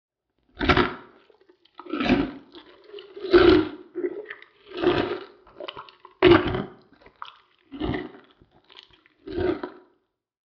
Sound effects > Other
HORRMonster Cinematis HalloweenSpecial Vol5 Munching 1 Freebie
A terrifying creature loudly crunching bones in a disturbing feast. This is one of the three freebies from my Halloween Special | Vol.5 pack.
creak, creepy, halloween, munching, bonecreak, hounted, spooky, monster, bone, scary